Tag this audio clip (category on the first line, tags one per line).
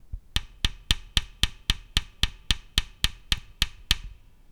Sound effects > Objects / House appliances
field-recording
tic-tic
wood